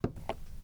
Sound effects > Other mechanisms, engines, machines
gun handle 6
Designed foley sound for less aggressive gun pickup from wooden table, with additional scrapes.
gun, handgun, handle, scrape, soft, table, wood